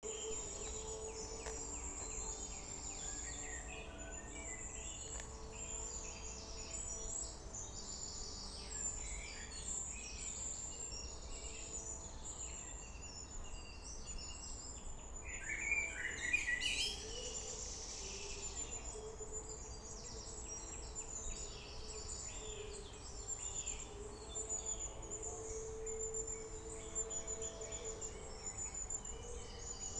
Soundscapes > Nature
Sounds of birds singing in a park woodland, acoustic music played in the distance